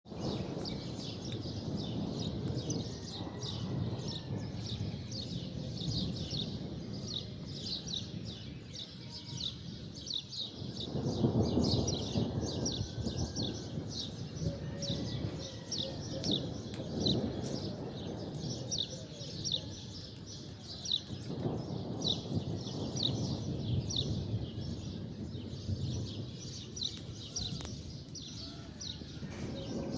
Soundscapes > Nature
Sound-of-Nature-With-Thunderstorm 1
Hear the thunder storm sound, great for making a video or as a background audio for game makers !!
thunder, rolling-thunder, weather, thunderstorm, thunder-storm, nature